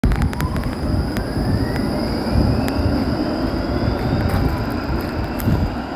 Sound effects > Vehicles
22tram toleaveintown
A tram is speeding up as it is leaving a tramstop. Recorded in Tampere with a samsung phone.
public-transport, transportation, tramway, tram